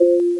Sound effects > Electronic / Design
note F blip electro
from a scale of notes created on labchirp for a simon-type game of chasing sounds and flashes.
tonal, blip, scales, electro, single-note